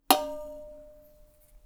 Sound effects > Other mechanisms, engines, machines
Woodshop Foley-071
bam, bang, boom, bop, crackle, foley, fx, knock, little, metal, oneshot, perc, percussion, pop, rustle, sfx, shop, sound, strike, thud, tink, tools, wood